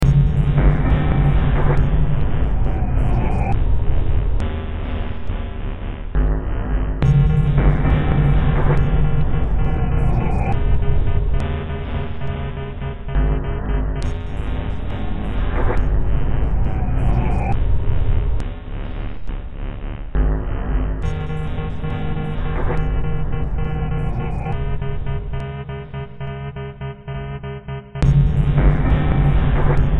Music > Multiple instruments
Demo Track #3595 (Industraumatic)
Ambient, Cyberpunk, Games, Horror, Industrial, Noise, Sci-fi, Soundtrack, Underground